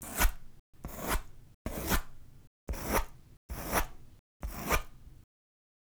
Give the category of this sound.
Sound effects > Objects / House appliances